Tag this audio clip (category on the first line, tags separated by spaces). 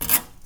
Sound effects > Other mechanisms, engines, machines
foley fx handsaw hit household metal metallic perc percussion plank saw sfx shop smack tool twang twangy vibe vibration